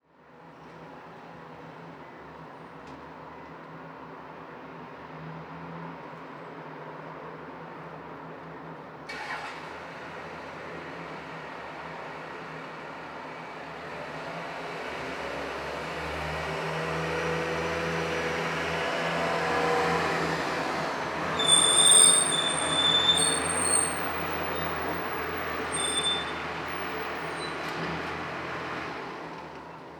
Soundscapes > Urban
A truck unloading some miscellaneous supplies for painters, a few things clatter and clang on the ground occasionally.